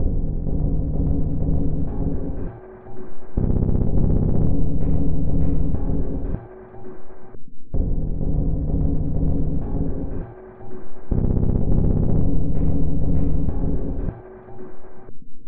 Instrument samples > Percussion
This 124bpm Drum Loop is good for composing Industrial/Electronic/Ambient songs or using as soundtrack to a sci-fi/suspense/horror indie game or short film.
Packs, Soundtrack, Alien, Samples, Underground, Weird, Ambient, Drum, Industrial, Dark, Loopable, Loop